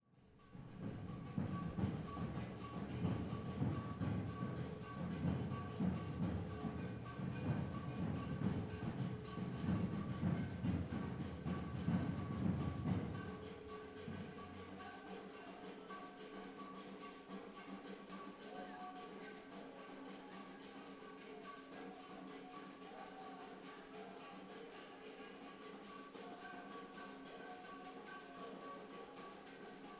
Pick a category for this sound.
Music > Multiple instruments